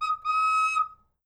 Music > Solo instrument
MUSCWind-Blue Snowball Microphone Recorder, Toy Factory Whistle, Simulated Nicholas Judy TDC
A recorder simulating a toy factory whistle.
toy, Blue-Snowball, recorder, factory, whistle, Blue-brand